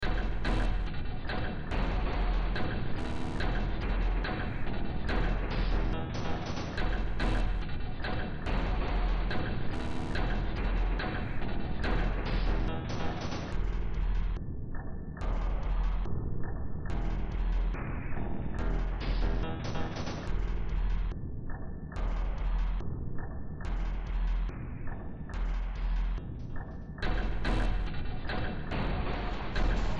Music > Multiple instruments
Demo Track #3473 (Industraumatic)
Track taken from the Industraumatic Project.
Ambient, Noise, Games, Industrial, Sci-fi, Underground, Horror, Cyberpunk, Soundtrack